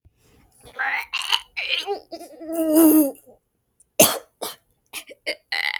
Speech > Solo speech
dude, idk im trying to make some baby vomiting or some disgusting sounds also there were no babies included in this recording